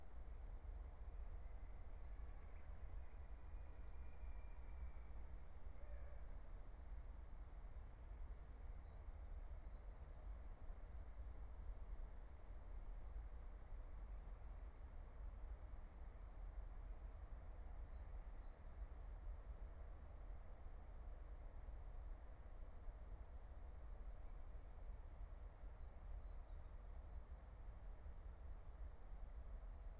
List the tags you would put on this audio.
Soundscapes > Nature
soundscape raspberry-pi phenological-recording alice-holt-forest meadow nature natural-soundscape field-recording

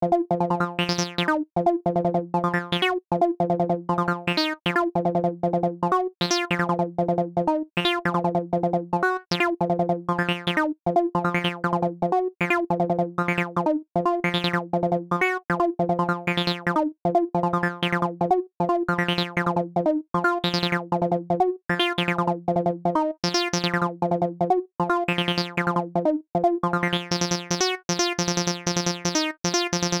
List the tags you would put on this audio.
Music > Solo instrument
house synth 303 electronic

